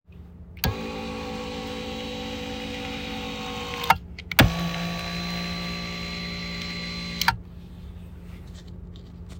Sound effects > Other mechanisms, engines, machines
car-seat-mechanicshifting
Car seat mechanical sound movement adjustments.
automobile, car, field-recording, seat, shifting, sound-effects, vehicle